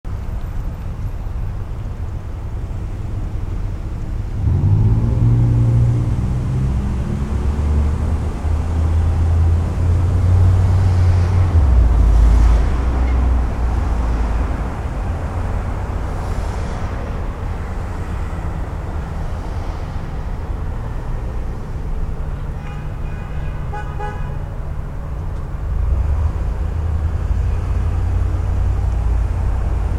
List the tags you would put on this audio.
Soundscapes > Urban
cars city street traffic

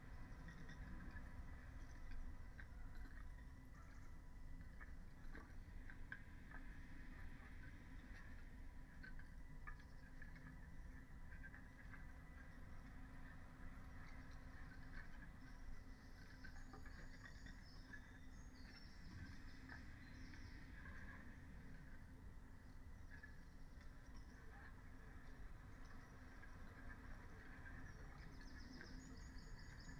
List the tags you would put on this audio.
Soundscapes > Nature
raspberry-pi sound-installation artistic-intervention data-to-sound alice-holt-forest Dendrophone nature field-recording soundscape weather-data natural-soundscape phenological-recording modified-soundscape

